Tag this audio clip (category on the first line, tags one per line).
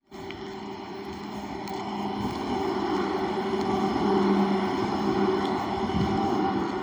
Sound effects > Vehicles
tram
vehicle
drive